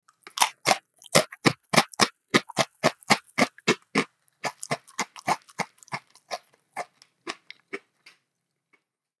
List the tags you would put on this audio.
Sound effects > Other
bag
bite
bites
bruschetta
crunch
crunchy
design
effects
foley
food
handling
plastic
postproduction
recording
rustle
SFX
snack
sound
texture

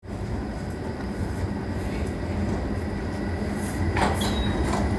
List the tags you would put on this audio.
Sound effects > Vehicles
field-recording
Tampere
city
traffic
tram

